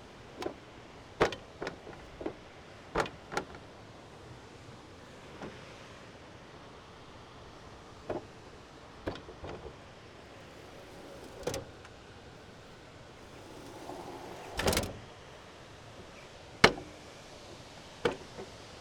Sound effects > Objects / House appliances
250629 Albi Belvédère du Pont Vieux - VR binoculars - MKE600
Subject : Foley recordings of the "binoculars" (actually a screen thing) in Albi. Date YMD : 2025 June 29 Sunday Morning (07h30-08h30) Location : Albi 81000 Tarn Occitanie France. Sennheiser MKE600 with stock windcover P48, no filter. Weather : Sunny no wind/cloud. Processing : Trimmed in Audacity. Notes : Tips : With the handheld nature of it all. You may want to add a HPF even if only 30-40hz.
City, 2025, 81000, MKE600, Shotgun-mic, tourist-googles, cling, Sunday, Albi, Occitanie, manipulation, Morning, clang, June, Hypercardioid, tourist-telescope, France, Tarn, Outdoor, Early-morning